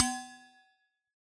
Sound effects > Objects / House appliances
Resonant coffee thermos-027
recording, sampling, percusive